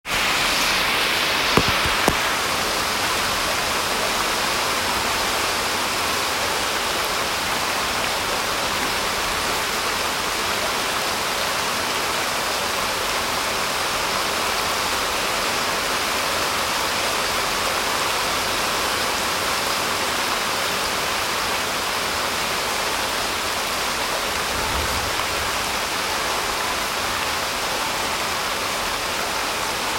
Sound effects > Natural elements and explosions
Rain in the VietNam
raindrops, weather